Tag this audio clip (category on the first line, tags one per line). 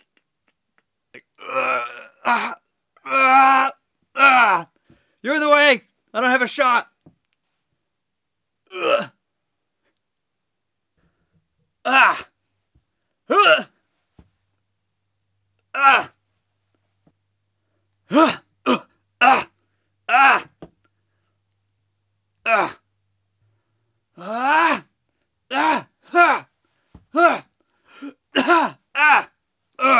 Sound effects > Human sounds and actions
hit
henchman
male
goon
vocal
voice
fighting
groan
fight
combat